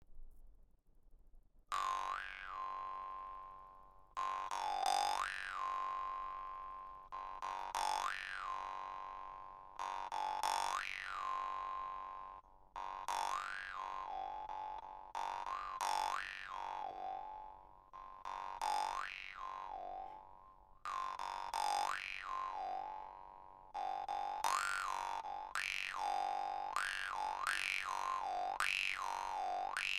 Music > Solo instrument
Vargan solo was recorded on Pixel 6pro
ethno, khomus, vargan